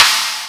Instrument samples > Percussion
crash China electro 2
I reshaped louder the attack with WaveLab 11's envelope. I only doubled some small region after the left attack to create a phase delay. tags: Avedis bang China clang clash crack crash crunch cymbal Istanbul low-pitched Meinl metal metallic multi-China multicrash Paiste polycrash Sabian shimmer sinocrash Sinocrash sinocymbal Sinocymbal smash Soultone spock Stagg Zildjian Zultan